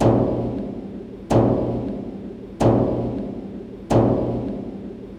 Sound effects > Other
Hollow metal slap
Slapping a hollow metal sculpture in a park